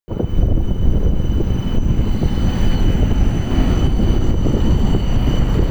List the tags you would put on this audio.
Sound effects > Vehicles
vehicle tram rail

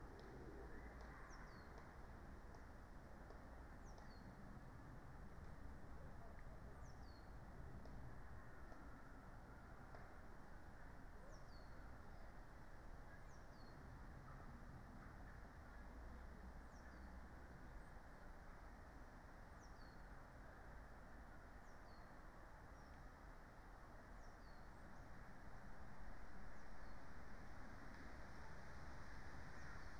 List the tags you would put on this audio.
Soundscapes > Nature
nature phenological-recording soundscape sound-installation data-to-sound modified-soundscape field-recording alice-holt-forest Dendrophone raspberry-pi natural-soundscape artistic-intervention weather-data

